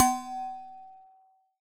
Sound effects > Objects / House appliances
Resonant coffee thermos-018
percusive, sampling